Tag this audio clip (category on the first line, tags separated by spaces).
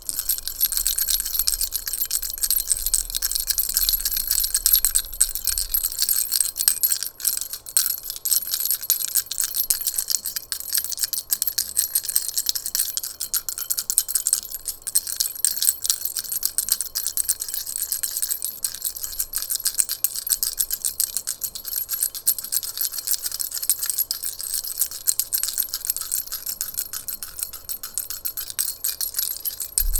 Music > Solo percussion
bell sleigh muted toy Blue-brand ring